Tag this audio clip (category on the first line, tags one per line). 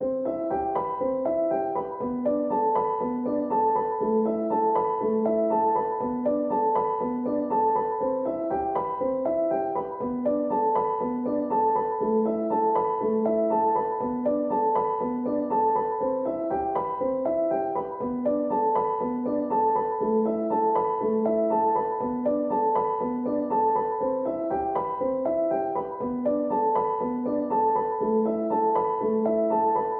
Music > Solo instrument
simplesamples
loop
samples
simple
pianomusic
reverb
120bpm
free
piano
music
120